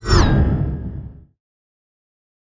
Sound effects > Other
Sound Design Elements Whoosh SFX 028
movement, film, sweeping, transition, element, cinematic, dynamic, whoosh, design, production, swoosh, elements, effect, motion, trailer, fast, sound, ambient, fx, audio, effects